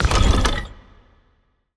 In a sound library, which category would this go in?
Sound effects > Other mechanisms, engines, machines